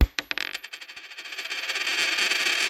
Sound effects > Objects / House appliances
OBJCoin-Samsung Galaxy Smartphone Dime, Drop, Spin 06 Nicholas Judy TDC
dime, drop, Phone-recording